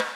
Music > Solo percussion
Snare Processed - Oneshot 203 - 14 by 6.5 inch Brass Ludwig
acoustic, beat, brass, crack, drum, drumkit, drums, flam, fx, hit, hits, kit, ludwig, perc, percussion, processed, realdrum, rim, rimshots, roll, snaredrum, snareroll